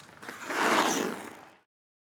Sound effects > Other
A c-cut style, low-centered, sharp turn with the player digging in hard on their edges.